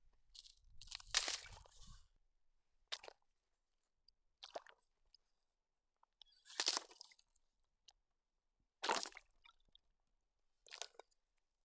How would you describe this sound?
Sound effects > Other
Crushing small pools of ice 2
Stomping on small pools of ice in the winter in northern Sweden.
winter, frost, cold, snow, ice, crushing